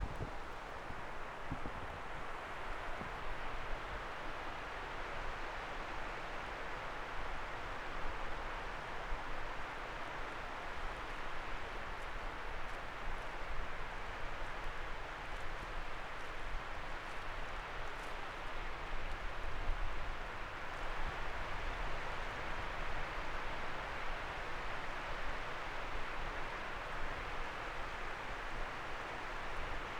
Soundscapes > Nature
Brown bears clamming at Silver Salmon Creek, Alaska
Coastal Brown Bears digging for clams at Silver Salmon Creek, Lake Clark National Park, Alaska
Beach, Seagulls, Waves, Coast, FieldRecording, Bears